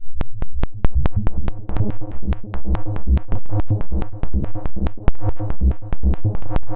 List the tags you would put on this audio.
Music > Solo instrument
bass
click
loop
square
wobble

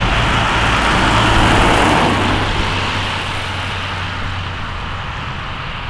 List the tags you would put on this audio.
Sound effects > Vehicles
drive
rainy
vehicle
car
automobile
field-recording

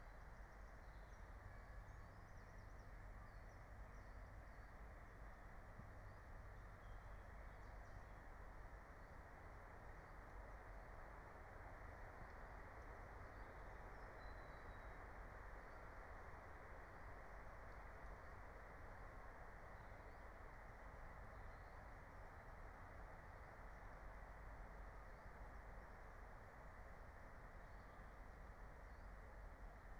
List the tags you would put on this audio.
Soundscapes > Nature
natural-soundscape
phenological-recording
nature
soundscape
alice-holt-forest
field-recording
raspberry-pi
meadow

